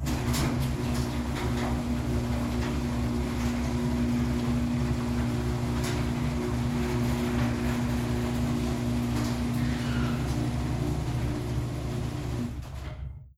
Sound effects > Other mechanisms, engines, machines
DOORElec-Samsung Galaxy Smartphone Garage Door, Mechanical, Close Nicholas Judy TDC
A mechanical garage door closing.
close, foley, garage, mechanical